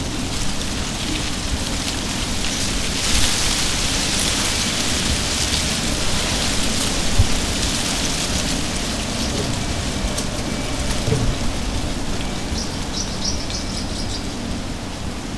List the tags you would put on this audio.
Sound effects > Natural elements and explosions
FR-AV2
Shotgun-microphone
Shotgun-mic
Quemigny-Poisot
Single-mic-mono
wind
MKE600
rattling
leaf
Sennheiser
Hypercardioid
MKE-600
Tascam
leafs

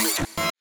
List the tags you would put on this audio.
Sound effects > Electronic / Design
one-shot
digital

